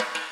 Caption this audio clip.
Music > Solo percussion
Snare Processed - Oneshot 209 - 14 by 6.5 inch Brass Ludwig
rim, roll, snares, snareroll, drum, rimshot, realdrums, beat, drumkit, kit, ludwig, brass, rimshots, perc, hits, drums, reverb, acoustic, fx, oneshot, snare, processed, snaredrum, crack, sfx, flam, hit, realdrum, percussion